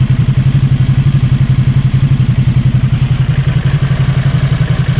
Sound effects > Other mechanisms, engines, machines
puhelin clip prätkä (11)
Ducati, Motorcycle, Supersport